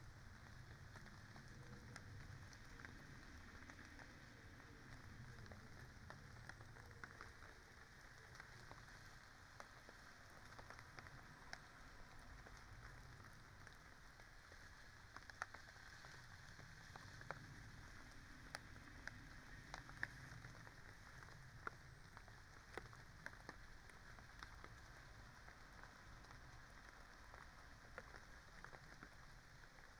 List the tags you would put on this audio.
Soundscapes > Nature
soundscape
data-to-sound
modified-soundscape
natural-soundscape
nature
field-recording
phenological-recording
raspberry-pi
Dendrophone
artistic-intervention
weather-data
sound-installation
alice-holt-forest

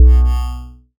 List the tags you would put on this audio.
Instrument samples > Synths / Electronic
fm-synthesis
bass
additive-synthesis

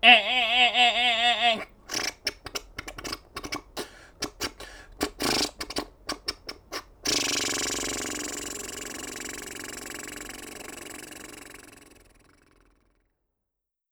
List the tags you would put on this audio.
Sound effects > Vehicles
away
comedic
start

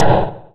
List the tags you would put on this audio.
Instrument samples > Percussion
rocket
fake
weird
UFO-snare
spacetime
aliendrums
noise
electronic
freaky
futuristic
brown-noise
sound-design
fakesnare
alien
sci-fi
digital

